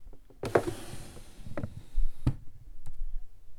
Sound effects > Objects / House appliances
Wooden Drawer 11
wooden, open, drawer